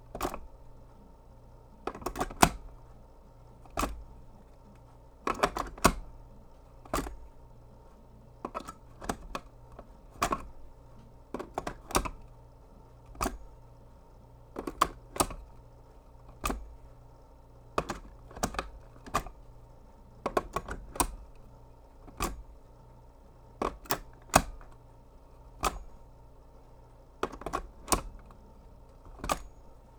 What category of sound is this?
Sound effects > Objects / House appliances